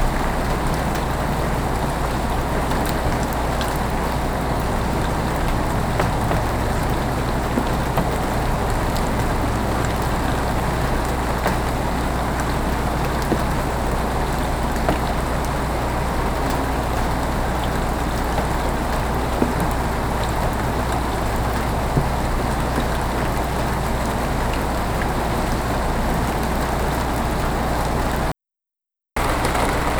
Soundscapes > Nature
Rain outdoors
Rain recorded outdoors in a suburban neighborhood. The first part is standard rainfall and the second part is heavy rainfall. Recorded using a Amazon Basics Microphone AMZ-S6524A.
outdoor, rain, raindrops, rainfall, raining, storm, weather